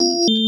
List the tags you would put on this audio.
Sound effects > Electronic / Design
alert
digital
interface
message
notification
selection

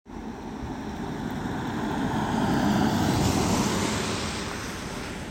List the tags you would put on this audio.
Sound effects > Vehicles
car
tampere
field-recording

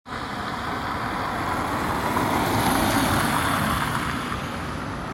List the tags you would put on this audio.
Sound effects > Vehicles
rain
tampere
vehicle

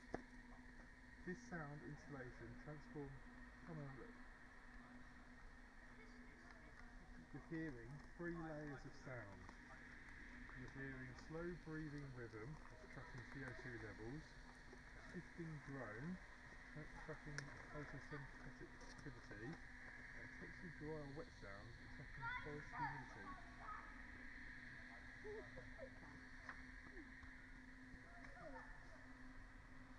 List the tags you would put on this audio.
Soundscapes > Nature
data-to-sound
artistic-intervention
nature
field-recording
modified-soundscape
Dendrophone
raspberry-pi
natural-soundscape
weather-data
phenological-recording
alice-holt-forest
soundscape
sound-installation

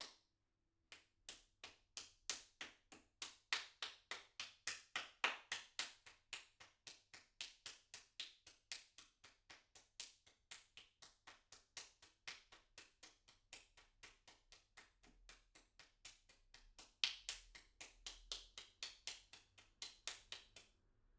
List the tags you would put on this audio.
Sound effects > Human sounds and actions
Applause
AV2
Solo-crowd
clapping
Applauding
person
NT5
Rode
Tascam
individual
indoor
Applaud
XY
FR-AV2
solo
clap

